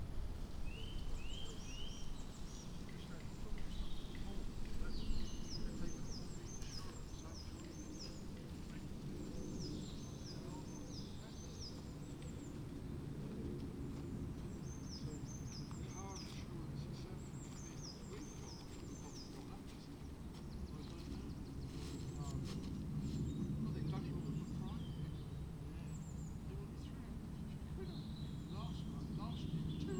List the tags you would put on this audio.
Soundscapes > Nature
natural-soundscape alice-holt-forest

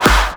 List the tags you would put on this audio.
Sound effects > Human sounds and actions
kick slaps

